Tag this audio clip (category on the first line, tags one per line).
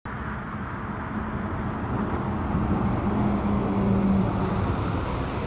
Sound effects > Vehicles
vehicle
engine
bus